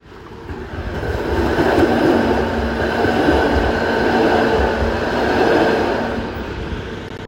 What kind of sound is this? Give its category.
Soundscapes > Urban